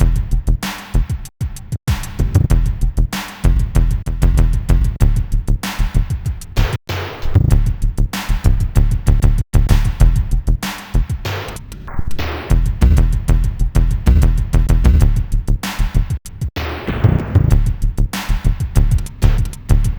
Music > Multiple instruments
Industrial Beat (96 BPM, 8 bars) #1
I'm fascinated by what one can do with rests...